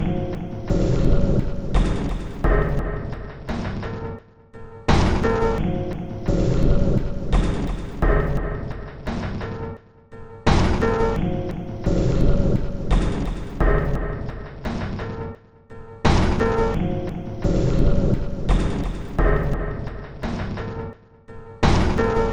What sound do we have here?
Instrument samples > Percussion
This 86bpm Drum Loop is good for composing Industrial/Electronic/Ambient songs or using as soundtrack to a sci-fi/suspense/horror indie game or short film.
Soundtrack, Samples, Loop, Alien, Weird, Underground, Dark, Industrial, Drum, Packs, Loopable, Ambient